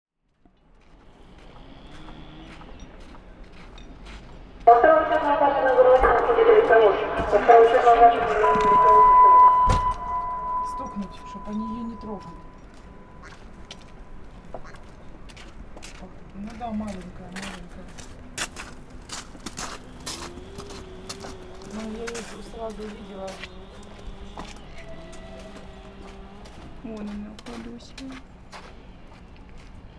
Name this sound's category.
Soundscapes > Urban